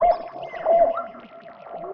Soundscapes > Synthetic / Artificial
LFO Birdsong 52
Birdsong, LFO, massive